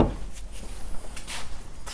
Sound effects > Other mechanisms, engines, machines
metal shop foley -032
sound, wood, tink, shop, little, bang, bop, rustle, tools, fx, bam, foley, metal, pop, oneshot, thud, boom, knock, strike, perc, crackle, sfx, percussion